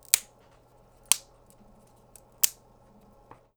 Sound effects > Objects / House appliances
WOODBrk-Blue Snowball Microphone, CU Stick, Small, Breaks, X3 Nicholas Judy TDC
A small stick breaking. Three times.
Blue-brand, Blue-Snowball, break, foley, small, stick